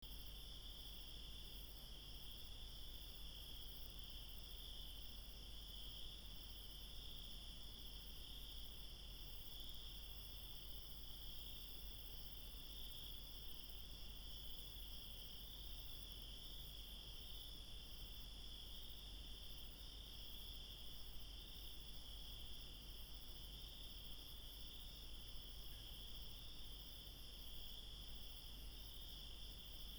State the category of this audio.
Soundscapes > Nature